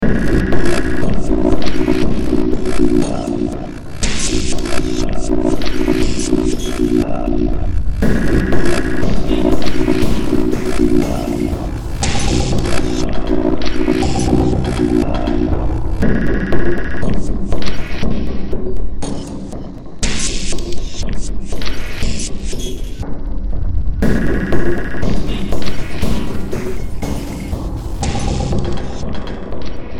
Music > Multiple instruments
Demo Track #3510 (Industraumatic)
Ambient, Cyberpunk, Games, Horror, Industrial, Noise, Sci-fi, Soundtrack, Underground